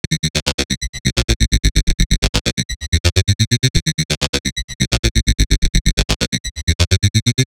Music > Solo instrument
vocal bass - 128 bpm
The base waveform before processing is derived from a sawtooth wave which itself pitch shifts.
bass, vocal, vox, Weird